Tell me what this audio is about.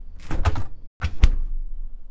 Sound effects > Objects / House appliances
Fridge door open and close
Home recording of opening and closing a fridge door. Recorded with a Rode NT1-A.
fridge, home-recording, refridgerator